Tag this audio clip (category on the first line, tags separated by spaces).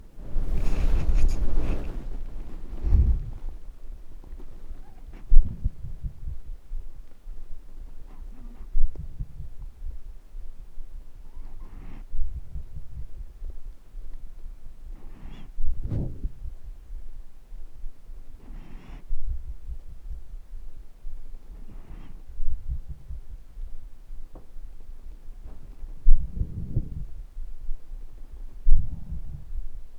Sound effects > Animals
2025 breath breathing cat elderly female FR-AV2 NT5 Rode sleeping tascam up-close